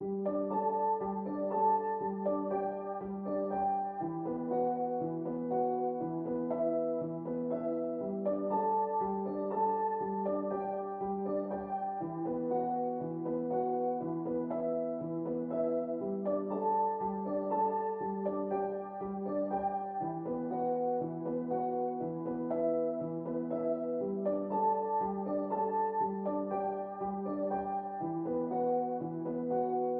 Music > Solo instrument
Piano loops 197 efect octave long loop 120 bpm
pianomusic, samples, simple, 120bpm, music, 120, loop, simplesamples, free, reverb, piano